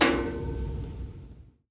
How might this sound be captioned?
Sound effects > Objects / House appliances
METLImpt-Samsung Galaxy Smartphone, CU Back Porch Railing Hit 02 Nicholas Judy TDC
Metal back porch railing hit.
back-porch, hit, metal, Phone-recording, railing